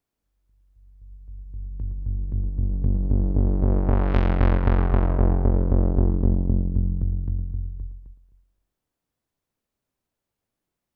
Sound effects > Electronic / Design
Analogue Station ID "Menace"
created with my Arturia Microbrute. Inspired by the sound effects that accompanied early motion graphics. free to create your own animation for or to sample.